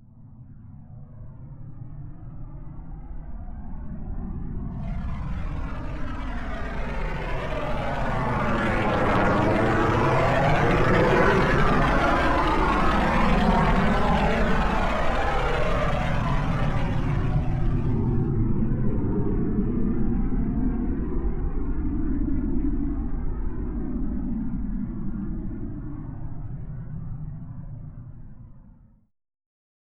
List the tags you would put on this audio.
Sound effects > Electronic / Design
Roar Synth Rocket

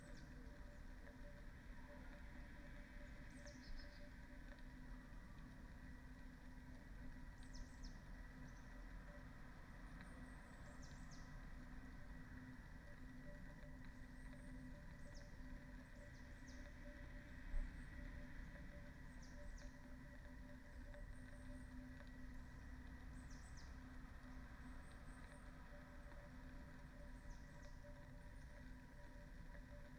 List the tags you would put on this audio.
Soundscapes > Nature
weather-data Dendrophone nature field-recording data-to-sound

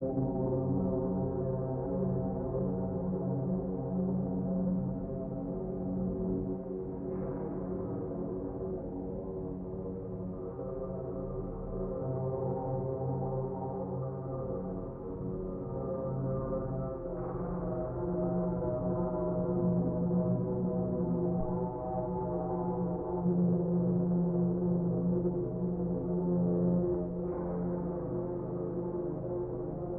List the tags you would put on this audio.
Music > Multiple instruments
ambiance ambient dark

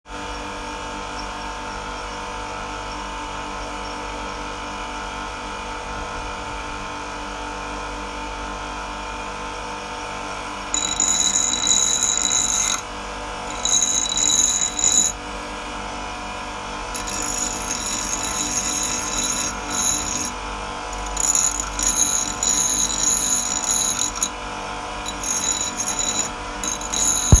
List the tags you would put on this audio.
Sound effects > Other mechanisms, engines, machines
electricity machine motor

